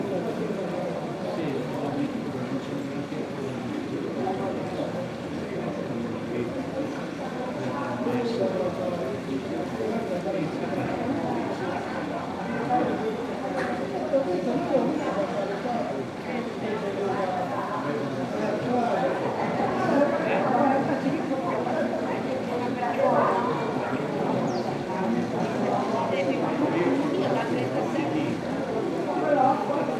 Soundscapes > Urban
Ten minutes at: the Square in the morning
Field recording taken in the square of a small mountain village in the Maritime Alps in Italy, at 10 a.m., when the few people present are shopping in the shop and having breakfast at the bar. In the background, the sound of coffee cups and glasses, people talking, the trickling of a fountain, birds (Italian sparrows, swallows) and the slow flow of quiet life.
mountain, water, breakfast, field-recording, people, fountain, coffee, square